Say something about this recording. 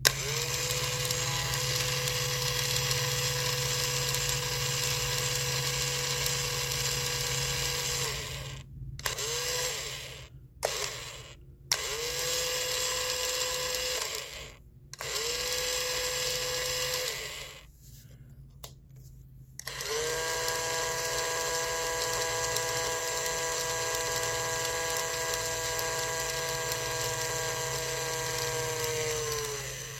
Sound effects > Objects / House appliances
TOYMech-Samsung Galaxy Smartphone, MCU Impact Drill Nicholas Judy TDC
A toy impact drill.
drill, toy, impact